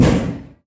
Sound effects > Objects / House appliances
METLImpt-Samsung Galaxy Smartphone, CU Bang Nicholas Judy TDC
A metal impact. Bang. Recorded at Lowe's.